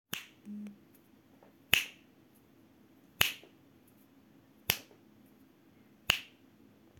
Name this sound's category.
Sound effects > Human sounds and actions